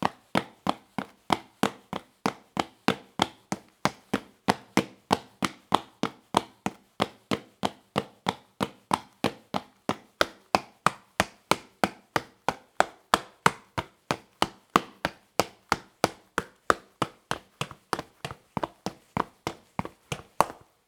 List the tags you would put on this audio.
Sound effects > Human sounds and actions
running
foley
tile
footsteps

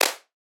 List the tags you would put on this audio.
Instrument samples > Synths / Electronic
fm
electronic
synthetic

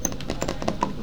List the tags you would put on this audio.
Sound effects > Animals
Running
Footsteeps
Horror
Terror
Animal
Insects
Game

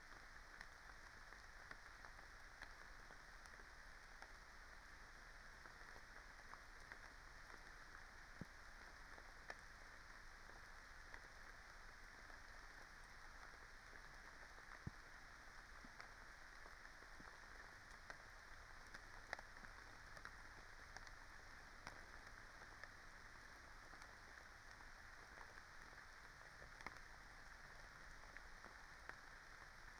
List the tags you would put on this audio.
Soundscapes > Nature
raspberry-pi
nature
alice-holt-forest
artistic-intervention
soundscape
modified-soundscape
data-to-sound
phenological-recording
sound-installation
field-recording
weather-data
natural-soundscape
Dendrophone

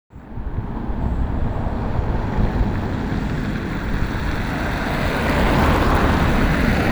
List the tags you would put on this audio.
Sound effects > Vehicles
car
traffic
vehicle

